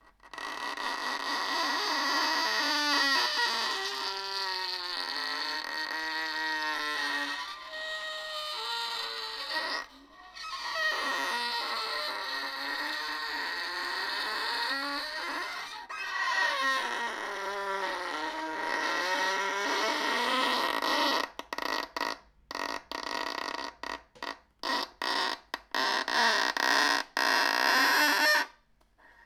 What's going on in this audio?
Instrument samples > String
Bowing broken violin string 20
horror, strings, broken, violin, uncomfortable, beatup, creepy, unsettling, bow